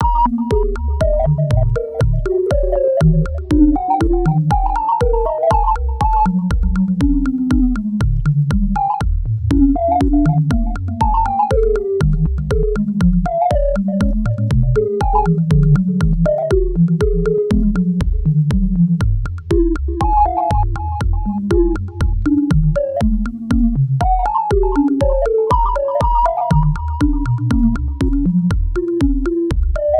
Music > Solo percussion
Aerial Drum Loop with Un-tuned Drums 120bpm
A percussion loop inspired by using random untuned drums that create this kind of aerial and non-predictable future.